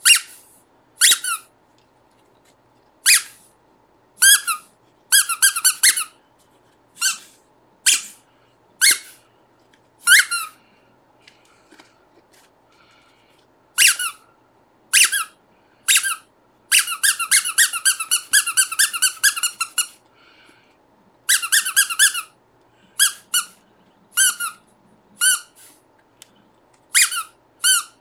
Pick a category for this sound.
Sound effects > Objects / House appliances